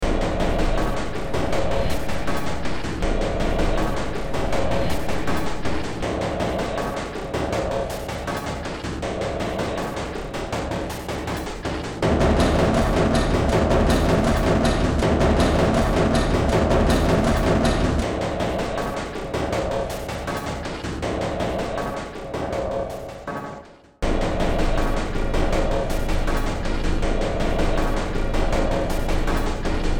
Music > Multiple instruments
Short Track #3345 (Industraumatic)
Games, Horror, Noise